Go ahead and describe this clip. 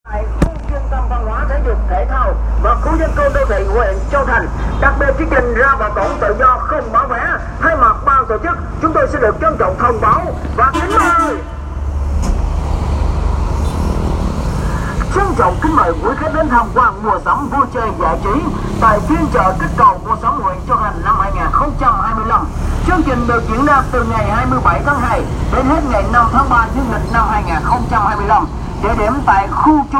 Speech > Solo speech
Quảng Cáo Phiên Chợ Mua Sắm Vá Giải Trí Tại An Châu - Advertize Fair At An Châu
Advertize fair at An Châu. 2025.02.28 16:56
voice, man, advertize, male, viet